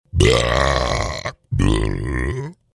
Sound effects > Human sounds and actions
Audio of my friend Will burping twice.